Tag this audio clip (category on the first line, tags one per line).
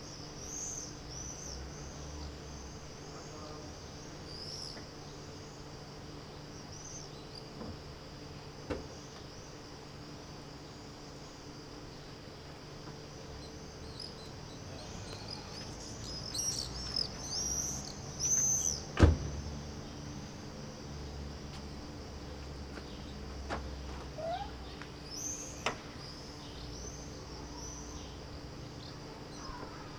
Soundscapes > Urban
Hypercardioid
FR-AV2
July
field-recording
Single-mic-mono
Tascam
bell
Aude
MKE600
ambience
11260
church
ringing
2025
Sennheiser
ding
Juillet
Shotgun-mic
Esperaza
outdoor
Shotgun-microphone
MKE-600
church-bell
dong